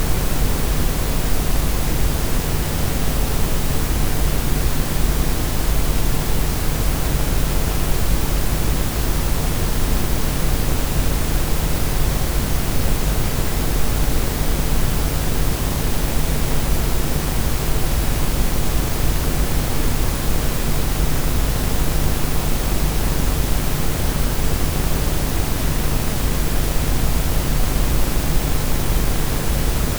Sound effects > Electronic / Design
50hz static white noise
Subject : A 50hz static noise. Synth generated in audacity. Date YMD : 2026 January 22 Location Computer in France. Hardware : Weather : Processing : Made in audacity. With a 50hz square wave, and additional overtone/undertone waves of different shapes, noticeably a "saw" that chops off at the start of each square thing. With a stereo white noise (boosted at 50hz) and a underlying brown noise.